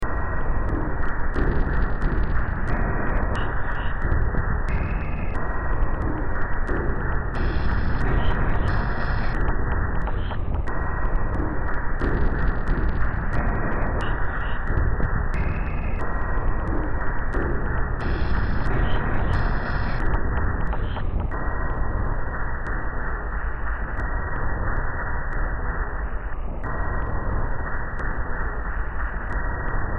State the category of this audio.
Music > Multiple instruments